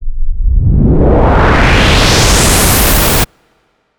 Sound effects > Electronic / Design
A basic riser for your music and cinematic needs.